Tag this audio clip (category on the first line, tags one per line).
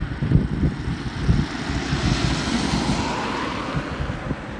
Soundscapes > Urban
car
city
driving
tyres